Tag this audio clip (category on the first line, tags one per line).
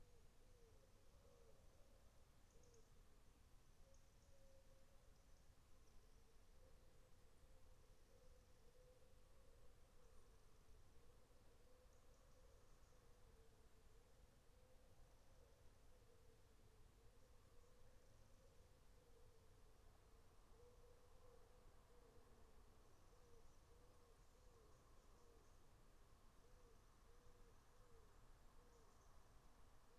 Soundscapes > Nature
raspberry-pi
field-recording
phenological-recording
nature
soundscape
natural-soundscape
meadow
alice-holt-forest